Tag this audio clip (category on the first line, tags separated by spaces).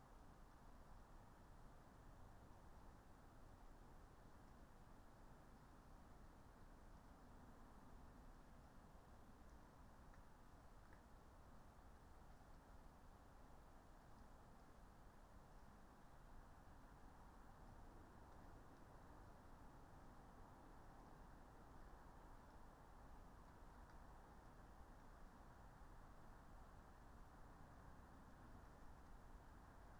Soundscapes > Nature
raspberry-pi nature sound-installation phenological-recording weather-data modified-soundscape artistic-intervention natural-soundscape Dendrophone soundscape data-to-sound alice-holt-forest field-recording